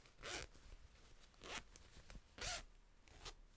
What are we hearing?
Sound effects > Human sounds and actions
This is me undoing the long zip of a dress. I recorded it with my Pixel 9 phone using the voice recorder app. I struggle to find a realistic zip sound for a dress so I made one.